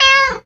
Sound effects > Animals
Meow said the cat.